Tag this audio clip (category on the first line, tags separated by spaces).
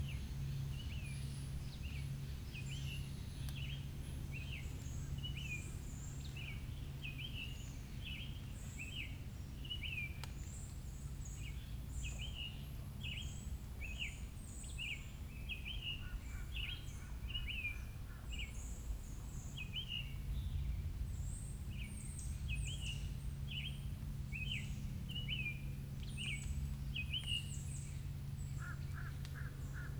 Soundscapes > Nature
Birds Environment Forest Nature Peaceful Soundscape